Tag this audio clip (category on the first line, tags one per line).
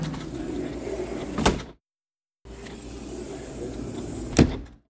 Sound effects > Objects / House appliances
patio
Phone-recording
foley
door
slide
open
close